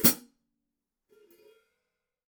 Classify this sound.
Music > Solo instrument